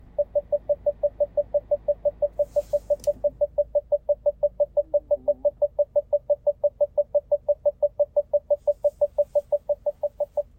Sound effects > Other mechanisms, engines, machines
car-perimeterbeeps
Car vehicle engine starting with extra door opening beep indicator.